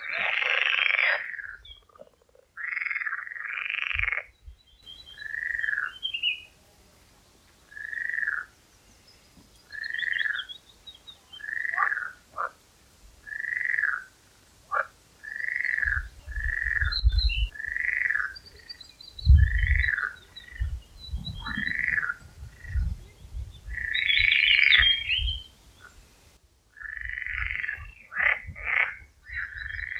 Sound effects > Animals
Änggårdsbergen 5 frogs 2 (cleaned)
Frogs, early morning, early summer. Frogs calling and spawning. Änggårdsbergen, Göteborg. Cleaned from hiss in Audacity (and the original recording is under same name - "cleaned")
ambience, birds, field-recording, frogs, nature